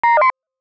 Sound effects > Other mechanisms, engines, machines
Small Robot - Talk 2
A talkative sounding small robot, bleeping. I originally designed this for some project that has now been canceled. Designed using Vital synth and Reaper
bleep, sci-fi, game, digital, robot, computer, artificial, science-fiction